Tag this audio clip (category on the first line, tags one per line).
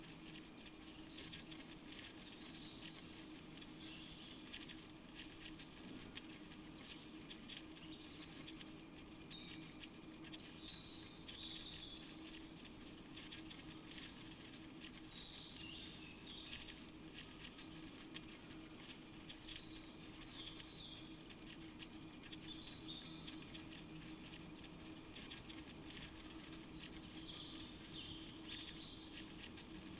Soundscapes > Nature
alice-holt-forest
soundscape
Dendrophone
artistic-intervention
sound-installation
modified-soundscape
data-to-sound
natural-soundscape
raspberry-pi
phenological-recording
weather-data
field-recording
nature